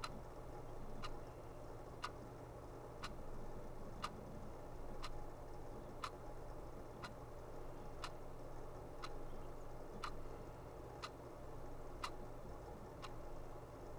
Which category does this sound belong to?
Sound effects > Objects / House appliances